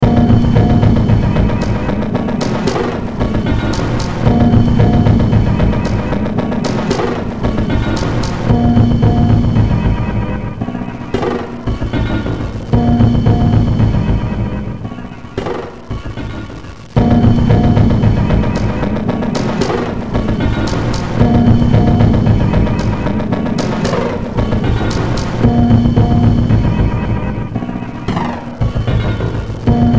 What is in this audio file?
Music > Multiple instruments
Demo Track #3144 (Industraumatic)
Underground, Sci-fi, Ambient, Industrial, Horror, Games, Cyberpunk, Noise, Soundtrack